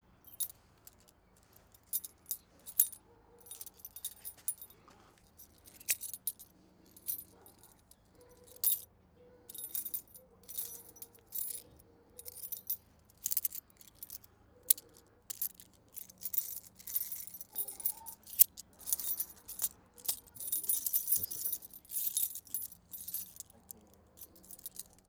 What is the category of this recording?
Sound effects > Other mechanisms, engines, machines